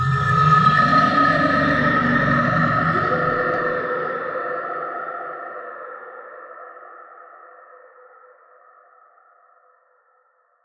Sound effects > Experimental
Creature Monster Alien Vocal FX-36
Alien, Animal, boss, Creature, Deep, demon, devil, Echo, evil, Fantasy, Frightening, fx, gamedesign, Groan, Growl, gutteral, Monster, Monstrous, Ominous, Otherworldly, Reverberating, scary, sfx, Snarl, Snarling, Sound, Sounddesign, visceral, Vocal, Vox